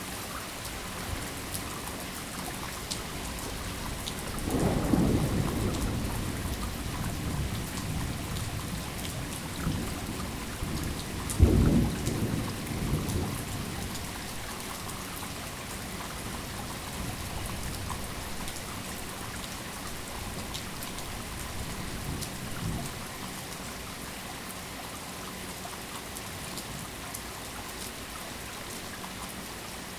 Sound effects > Natural elements and explosions
Rain dripping off of roof with distant thunder.
Rain, Runoff, Thunder